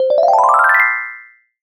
Sound effects > Electronic / Design
glissando celesta
Program : FL Studio, Purity
bell, cartoon, cartoon-sound, celesta, effect, fx, glissando, sfx, sound, sound-effect